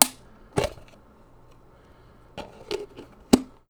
Sound effects > Objects / House appliances
OBJCont-Blue Snowball Microphone, CU Container, Cotton Candy, Lid Open, Close Nicholas Judy TDC
A cotton candy container lid opening and close.